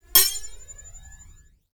Sound effects > Other
spell lightning b
18 - Average Lightning Spells Foleyed with a H6 Zoom Recorder, edited in ProTools
lightning, medium, spell